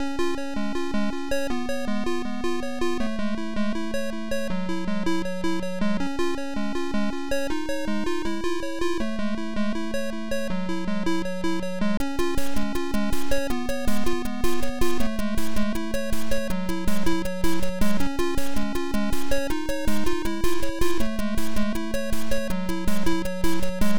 Music > Multiple instruments
160bpm retro game square wave song - mysterious exploration
160 bpm, made in FL Studio. Simple video game tune made with square wave. Starts with no drums, then drums come in on second loop. Sounds like something from a retro handheld video game. The tone of the music is mysterious, like something unfolding. Adventure, action, exploration, something is coming.
game, loop, retro-game, song, square-wave, squarewave, video-game